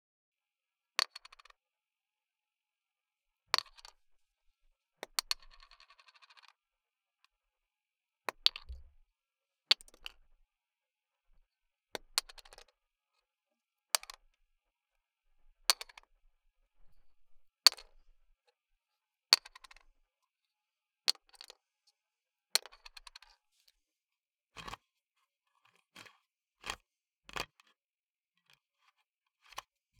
Sound effects > Objects / House appliances
Water Bottle Cap Screwed On - Unscrewed - Falling
The plastic cap of a water bottle falling onto a surface and spinning around before settling. Also includes the sound of the cap being screwed on and taken off.
drink, screw, unscrewing, screwing, plastic, dropping, cap, rotate, fall, lid, rattle, spin, bottle, water, unscrewed, falling, unscrew